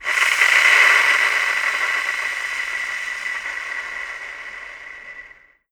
Sound effects > Other mechanisms, engines, machines
AIRHiss-Blue Snowball Microphone, CU Steam Release, Acme Windmaster Nicholas Judy TDC
Steam release. Simulated using an Acme Windmaster.